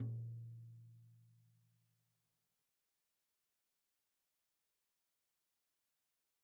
Music > Solo percussion
Med-low Tom - Oneshot 47 12 inch Sonor Force 3007 Maple Rack
acoustic, beat, drum, drumkit, drums, flam, kit, loop, maple, Medium-Tom, med-tom, oneshot, perc, percussion, quality, real, realdrum, recording, roll, Tom, tomdrum, toms, wood